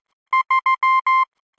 Sound effects > Electronic / Design
A series of beeps that denote the number 3 in Morse code. Created using computerized beeps, a short and long one, in Adobe Audition for the purposes of free use.